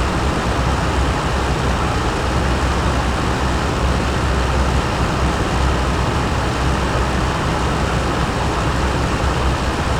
Sound effects > Objects / House appliances
Floor Fan (loop)
A small floor fan recorded from behind with stereo Clippy EM272 mics into a Zoom H1n. Cut and processed to loop perfectly.
ac, air, ambient, blow, box, circulator, clippy, drum, duct, em272, fan, h1n, home, house, loop, noise, pedestal, room, seamless, stand, stationary, texture, zoom